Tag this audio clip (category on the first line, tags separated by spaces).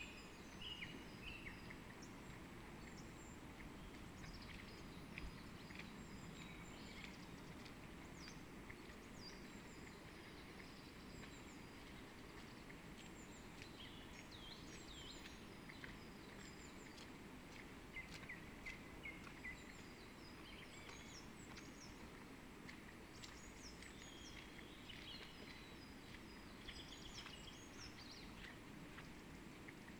Soundscapes > Nature
field-recording soundscape alice-holt-forest weather-data data-to-sound sound-installation phenological-recording raspberry-pi artistic-intervention modified-soundscape natural-soundscape Dendrophone nature